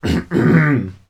Sound effects > Human sounds and actions
Noises - Clearing throat
Video-game, oneshot, Tascam, cough, Man, talk